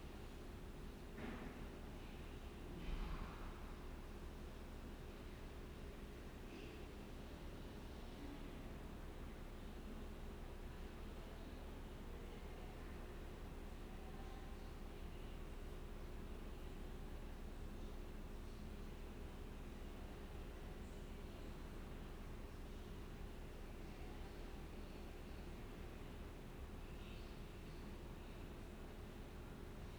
Sound effects > Other
Hotel corridor atmosphere
Recorded in a hotel corridor on the Greek island of Syros. Faint, distant, echoey voices can be heard, as well as some distant, nondescript sounds. The Zoom H2essential recorder was used to record this sound.